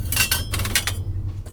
Sound effects > Objects / House appliances
Junkyard Foley and FX Percs (Metal, Clanks, Scrapes, Bangs, Scrap, and Machines) 22
rattle, Metallic, scrape, trash, Junk, tube, rubbish, garbage, Smash, Junkyard, Foley, SFX, Perc, dumping, Bang, Ambience, Bash, waste, Machine, Dump, Percussion, Clank, FX, Atmosphere, Robot, dumpster, Environment, Robotic, Metal, Clang